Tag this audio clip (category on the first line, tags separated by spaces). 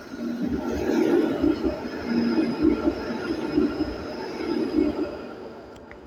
Sound effects > Vehicles
tram tramway transportation vehicle